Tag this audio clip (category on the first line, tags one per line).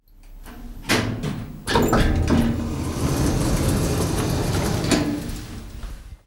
Sound effects > Other mechanisms, engines, machines
creak
door
doors
elevator
lift
mechanical
mechanism
metal
metalic
open
opening
squeal